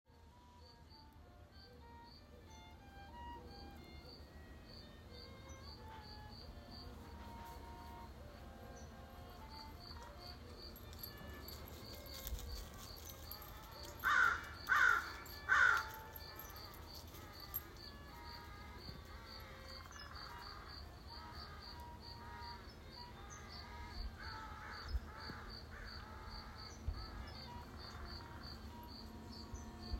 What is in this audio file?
Soundscapes > Nature
Wind in elm trees, Patrick playing violin, woodpecker, crows 06/09/2024
Wind in elm trees, Patrick playing violin, woodpecker, crows
crows, rural, violin, wind, woodpecker